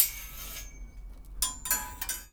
Sound effects > Objects / House appliances
Junkyard Foley and FX Percs (Metal, Clanks, Scrapes, Bangs, Scrap, and Machines) 46
Ambience, FX, Percussion, dumping, Smash, Environment, scrape, Junk, Bang, Clang, Bash, Metallic, rattle, tube, dumpster, Atmosphere, Dump, Metal, SFX, garbage, Robot, Machine, waste, Foley, Perc, trash, Junkyard, rubbish, Clank, Robotic